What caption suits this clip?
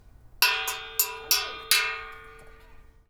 Sound effects > Objects / House appliances
Junkyard Foley and FX Percs (Metal, Clanks, Scrapes, Bangs, Scrap, and Machines) 70
Machine, Atmosphere, Clang, dumping, Junkyard, Dump, tube, Foley, Bash, Metal, Metallic, dumpster, scrape, garbage, FX, Junk, rubbish, Bang, Smash, waste, Perc, Ambience, Percussion, rattle, SFX, Robotic, Robot, Environment, trash, Clank